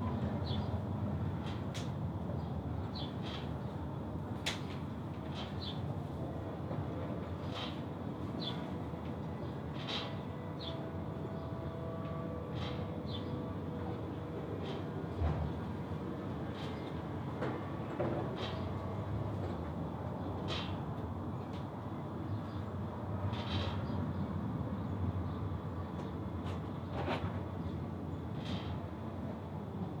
Soundscapes > Nature
Garden Recording 1
A random recording from my back garden. Day time. Cutlery being put down, cars passing by, overhead plane passing, birds, and a garden ornament being pushed around in a circle from the wind can be heard. Dual mono recording with a Shotgun mic.